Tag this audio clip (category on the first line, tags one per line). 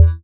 Instrument samples > Synths / Electronic
fm-synthesis
additive-synthesis